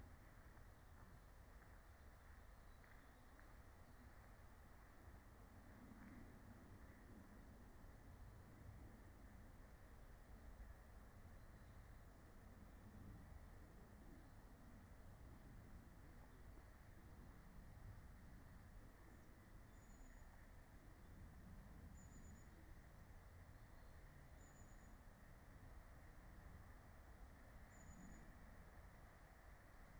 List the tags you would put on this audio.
Soundscapes > Nature
field-recording
phenological-recording
natural-soundscape
soundscape
raspberry-pi
meadow
alice-holt-forest
nature

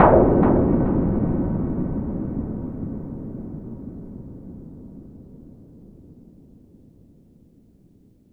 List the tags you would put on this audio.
Sound effects > Electronic / Design
design
impact
cinematic
dramatic
boom

